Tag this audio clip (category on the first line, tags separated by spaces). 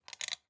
Sound effects > Other mechanisms, engines, machines
sample garage